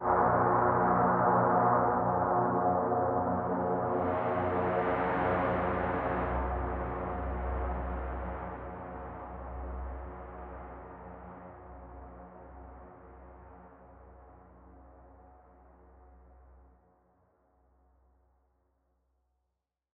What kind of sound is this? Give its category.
Music > Other